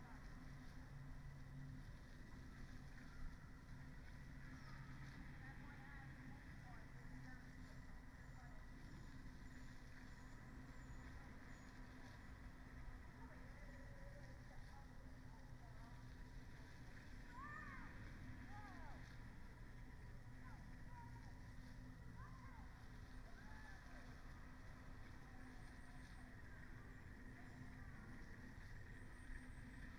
Soundscapes > Nature
Dendrophone is a site-specific sound installation by Peter Batchelor located in Alice Holt Forest, Surrey, UK, that transforms local environmental data into immersive sound textures. These recordings are made directly from the installation’s multichannel output and capture both its generative soundscape and the ambient natural environment. The sounds respond in real-time to three key ecological variables: • Humidity – represented sonically by dry, crackling textures or damp, flowing ones depending on forest moisture levels. • Sunlight energy – conveyed through shifting hissing sounds, juddery when photosynthetic activity is high, smoother when it's low. • Carbon dioxide levels – expressed through breathing-like sounds: long and steady when uptake is high, shorter and erratic when it's reduced. The installation runs on a DIY multichannel system based on Raspberry Pi Zero microcomputers and low-energy amplifiers.
alice-holt-forest, artistic-intervention, data-to-sound, Dendrophone, field-recording, modified-soundscape, natural-soundscape, nature, phenological-recording, raspberry-pi, sound-installation, soundscape, weather-data